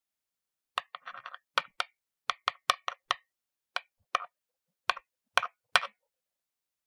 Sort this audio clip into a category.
Sound effects > Objects / House appliances